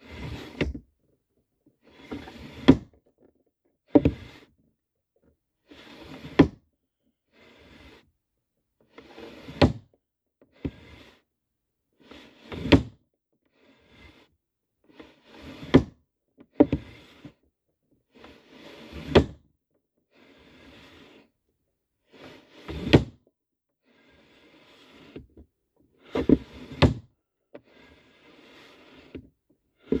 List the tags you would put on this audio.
Sound effects > Objects / House appliances
close
wooden
foley
Phone-recording
antique
open
drawer

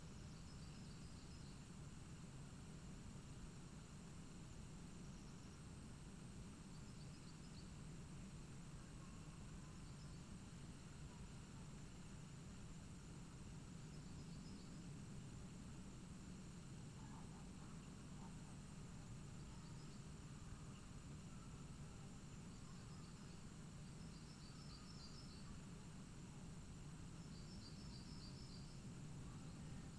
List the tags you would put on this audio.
Soundscapes > Nature
alice-holt-forest
Dendrophone
field-recording
nature
sound-installation

